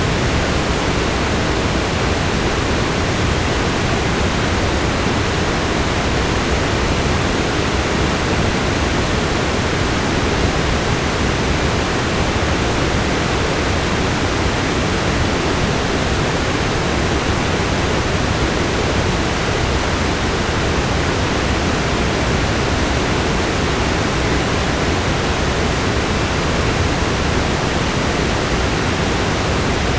Sound effects > Natural elements and explosions
250607 Albi River north
Subject : Recording of the river/dam northen side of the river. Date YMD : 2025 06 07 (Saturday). Early morning. Time =05h30ish Location : Albi 81000 Tarn Occitanie France. Hardware : Tascam FR-AV2, Rode NT5 with WS8 windshield. Had a pouch with the recorder, cables up my sleeve and mic in hand. Weather : Grey sky. Little to no wind, comfy temperature. Processing : Trimmed in Audacity. Other edits like filter, denoise etc… In the sound’s metadata. Notes : An early morning sound exploration trip. I heard a traffic light button a few days earlier and wanted to record it at a calmer time.
2025, 81000, Albi, City, dam, Early, Early-morning, France, FR-AV2, hand-held, handheld, Mono, morning, NT5, Occitanie, Outdoor, river, Rode, Saturday, Single-mic-mono, Tarn, Tascam, urbain, water, Wind-cover, WS8